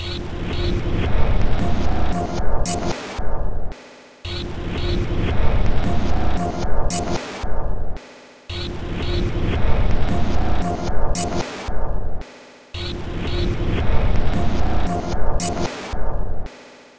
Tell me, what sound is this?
Instrument samples > Percussion
This 113bpm Drum Loop is good for composing Industrial/Electronic/Ambient songs or using as soundtrack to a sci-fi/suspense/horror indie game or short film.
Alien, Ambient, Dark, Drum, Industrial, Loop, Loopable, Packs, Samples, Soundtrack, Underground, Weird